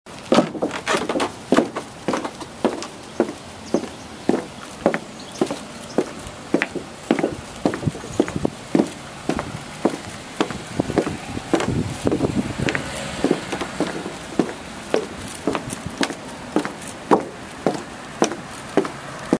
Sound effects > Human sounds and actions
Footsteps on wooden floor.
floor, foot, bridge, walk, wood